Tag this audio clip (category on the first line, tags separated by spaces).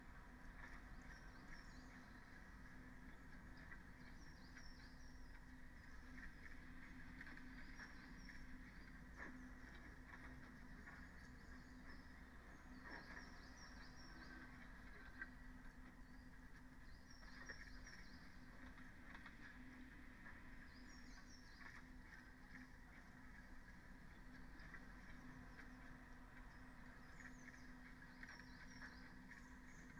Soundscapes > Nature
raspberry-pi natural-soundscape